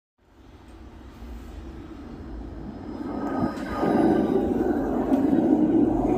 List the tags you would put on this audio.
Soundscapes > Urban
finland hervanta tram

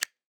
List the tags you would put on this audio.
Sound effects > Human sounds and actions
activation button click interface off switch toggle

